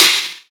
Instrument samples > Percussion
crash multi-Zildjian long 1
unbassed: It has many wide low-pitch wide-notch-cuts to allow low-pitch audibility for future edits. Many Zildjian crashes and China crashes mixed together and rendered with stairway/staircase/escalier/stepped/layered/terraced/segmented fade out (progressively attenuated layerchunks). Avedis Zildjian Company (multicrash mixdown) tags: Avedis Zildjian Avedis-Zildjian China sinocymbal Sinocymbal sinocrash Sinocrash multicrash polycrash multi-China Meinl, Sabian, Paiste, Zultan bang clang clash crack crash crunch cymbal Istanbul low-pitchedmetal metallic shimmer sinocrash smash Soultone Stagg
Soultone, Avedis, smash, cymbal, metallic, crash, Meinl, clang, Istanbul, bang, multicrash, clash, Avedis-Zildjian, Stagg, low-pitchedmetal, shimmer, crunch, sinocrash, crack, Zildjian, polycrash, multi-China, Paiste, Sabian, China, sinocymbal, Zultan